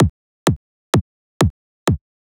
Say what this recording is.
Instrument samples > Percussion
FutureBounce-Kick 1
Synthed with 3xOSC only. Processed with Waveshaper, ZL EQ, ERA 6 De-Esser. Then tweaked ''Pogo'' amount in FLstudio sampler to make it punchy. Actually it retouched from a kick that called ''Analog Kick 2'' in my sample pack. P.S I don't know how to do channel mastering work so I put different version here, they may sounds same but acually they have a bit difference.
Drum, EDM, FutureBounce, kick, Punchy